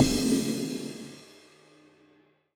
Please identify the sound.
Instrument samples > Percussion
A maincrash; for a true deepcrash see below. A basic drumfile.
splash, Stagg, maincrash, Zultan, smash, Meinl, Soultone, spock, crunch, metal, cymbal, 2kHz